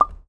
Sound effects > Objects / House appliances
A test tube top pop.